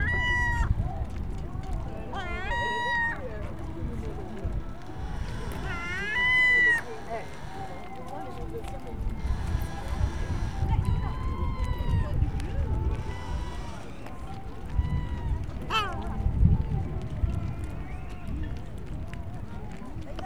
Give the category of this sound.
Soundscapes > Urban